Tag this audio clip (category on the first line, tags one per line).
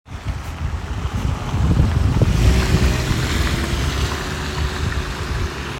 Sound effects > Vehicles
car
outside
automobile
vehicle